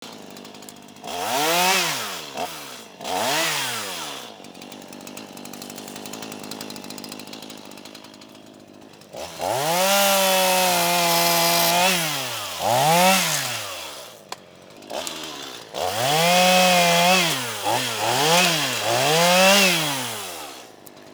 Sound effects > Other mechanisms, engines, machines
Small Chainsaw 3
A small chainsaw cutting down overgrown bushes. Rode NTG-3 (with Rycote fuzzy) into Sound Devices MixPre6. Recorded June 2nd, 2025, in Northern Illinois.
chainsaw, firewood, lumberjack, tree